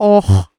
Speech > Other
Random friend sound amazed at mic
Random sound a friend did when I showed him my Dji Mic 3. Male in his 20s. Dji mic 3 internal recording. 20250917